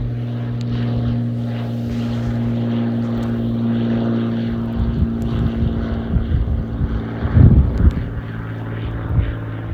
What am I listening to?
Soundscapes > Other
Cesna and wind
Recorded on iPhone16